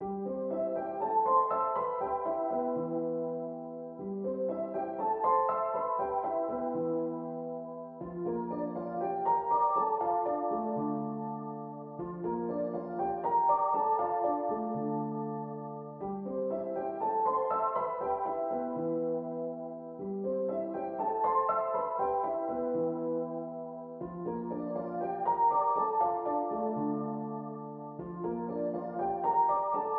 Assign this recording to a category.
Music > Solo instrument